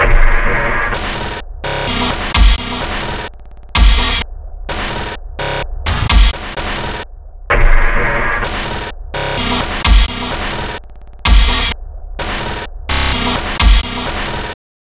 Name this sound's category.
Instrument samples > Percussion